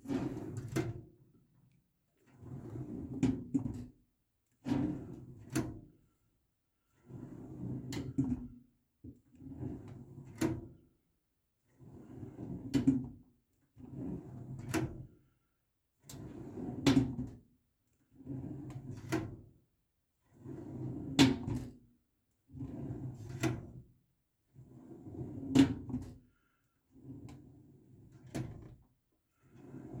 Sound effects > Objects / House appliances
DRWRMetl-Samsung Galaxy Smartphone File Cabinet, Slide Open, Close Nicholas Judy TDC
A file cabinet sliding opening and closing.